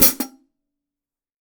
Music > Solo instrument
Vintage Custom 14 inch Hi Hat-009
Custom, Cymbal, Cymbals, Drum, Drums, Hat, Hats, HiHat, Kit, Metal, Oneshot, Perc, Percussion, Vintage